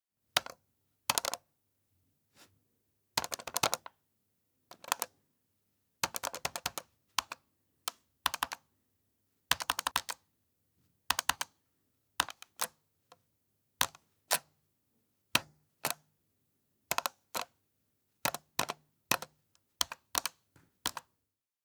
Sound effects > Objects / House appliances
Sharp, assertive mouse clicks with a punchy, aggressive attack. This is one of the several freebies from my Random Foley | Vol.5 | Peripherals | Freebie pack.